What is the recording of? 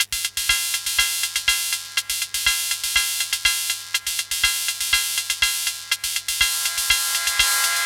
Music > Solo percussion
122 606Mod-HH Loop 05
606, Analog, Bass, Drum, DrumMachine, Electronic, Kit, Loop, Mod, Modified, music, Synth, Vintage